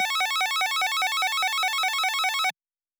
Sound effects > Electronic / Design
Old-school sci-fi style synthesised sci-fi radar scanner.